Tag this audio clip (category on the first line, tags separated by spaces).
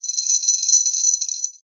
Sound effects > Objects / House appliances
dog kibble